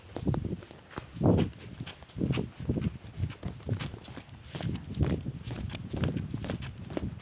Sound effects > Human sounds and actions
Half-sprinting on a concrete floor in work shoes... Recorded with Dolby On app, from my phone